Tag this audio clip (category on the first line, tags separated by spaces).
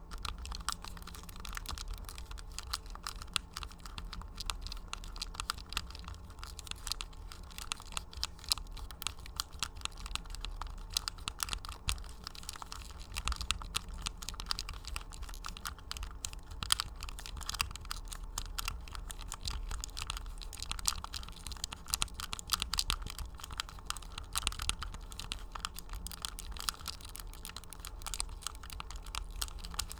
Sound effects > Objects / House appliances
nintendo-ds console play fidget Blue-Snowball Blue-brand foley